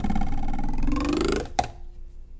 Sound effects > Objects / House appliances
knife and metal beam vibrations clicks dings and sfx-048
Beam, Clang, ding, Foley, FX, Klang, Metal, metallic, Perc, SFX, ting, Trippy, Vibrate, Vibration, Wobble